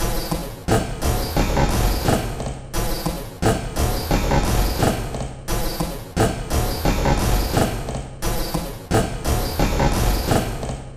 Instrument samples > Percussion
This 175bpm Drum Loop is good for composing Industrial/Electronic/Ambient songs or using as soundtrack to a sci-fi/suspense/horror indie game or short film.
Dark, Ambient, Drum, Industrial, Loop, Samples, Loopable, Alien, Packs, Underground, Soundtrack, Weird